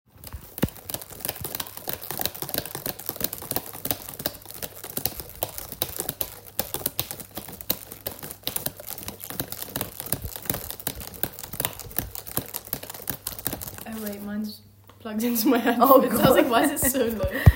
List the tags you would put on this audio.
Sound effects > Animals
Trotting
Horse
Running
Steps

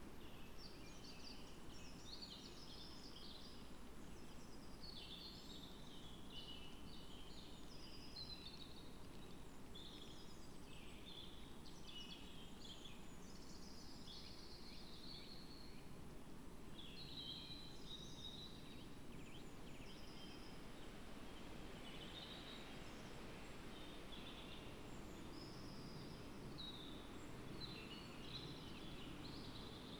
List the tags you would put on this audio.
Soundscapes > Nature
artistic-intervention
raspberry-pi
nature
natural-soundscape
weather-data
modified-soundscape
field-recording
phenological-recording
data-to-sound
Dendrophone
sound-installation
alice-holt-forest
soundscape

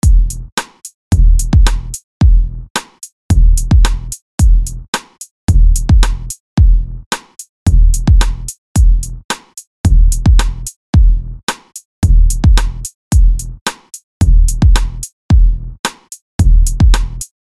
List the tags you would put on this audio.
Music > Solo instrument
110bpm
loop
beat
loops
drum
hiphop

